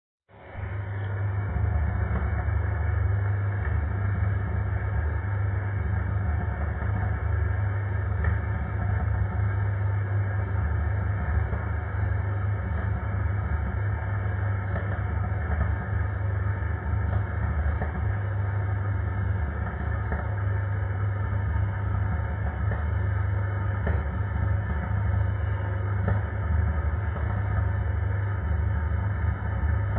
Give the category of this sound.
Sound effects > Objects / House appliances